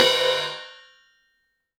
Music > Solo instrument
Cymbal Grab Stop Mute-003
Crash, Custom, Cymbal, Cymbals, Drum, Drums, FX, GONG, Hat, Kit, Metal, Oneshot, Paiste, Perc, Percussion, Ride, Sabian